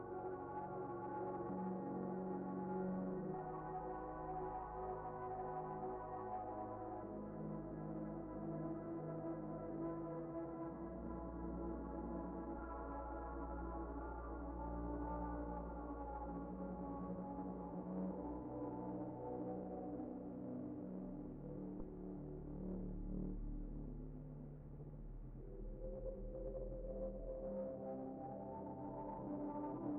Music > Multiple instruments
Fleeting Vision (Ambient)
recorded with Fl Studio 10
ambiance, ambience, ambient, atmo, atmosphere, experimental, reverb